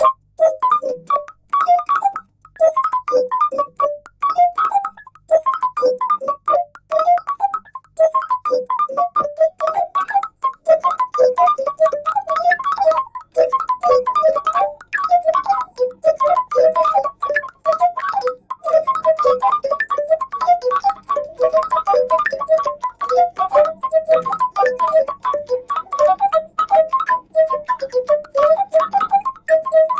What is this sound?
Music > Multiple instruments
Atonal Bouncing Texture #001
Experiments on atonal melodies that can be used as background textures. AI Software: Suno Prompt: experimental, magnetic, atonal, bouncing, low tones, bells, Mouth Blip Blops, echo, delays, reverb, weird, surprising
ai-generated, atonal, bells, blip-blop, bouncing, delay, echo, experimental, glitch, magnetic, ping-pong, reverb, soundscape, texture